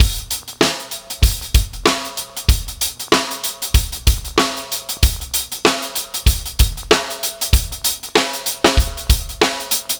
Music > Solo percussion
bb drum break loop wrek 96
96BPM, Acoustic, Break, Breakbeat, Drum, DrumLoop, Drums, Drum-Set, Dusty, Lo-Fi, Vintage, Vinyl